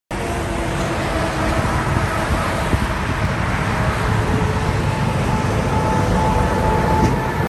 Sound effects > Vehicles
Sun Dec 21 2025 (25)
road, highway, car